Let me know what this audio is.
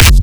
Instrument samples > Percussion
BrazilFunk Kick 16 Processed-1-Compressed
A easy kick made with 707 kick from flstudio original sample pack, used Waveshaper maxium output to make a crispy punch. Then I layered Grv kick 13 from flstudio original sample pack too. Easily add some OTT and Waveshaper to make it fat. Processed with ZL EQ.
BrazilFunk, BrazilianFunk, Brazilian, Kick, Distorted